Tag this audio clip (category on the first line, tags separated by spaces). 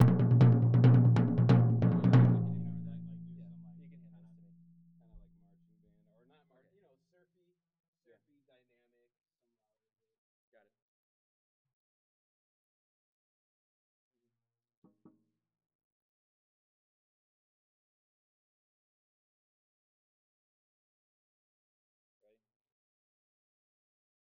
Music > Solo percussion
beat drum drumkit drums flam kit Medium-Tom med-tom oneshot perc percussion quality real recording roll Tom tomdrum toms wood